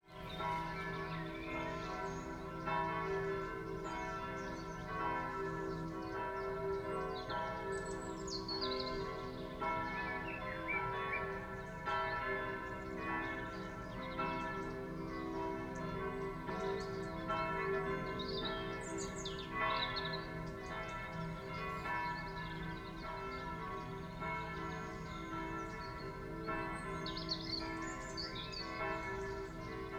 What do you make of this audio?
Soundscapes > Urban
Many bells - church - wedding
Many bells from a little church in France.